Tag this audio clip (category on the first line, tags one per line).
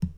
Sound effects > Objects / House appliances
foley
plastic
kitchen
container
pour
cleaning
shake
hollow
garden
liquid
household
metal
carry
knock
fill
clang
pail
scoop
lid
tool
slam
tip
bucket
water
debris
object
handle
clatter
spill
drop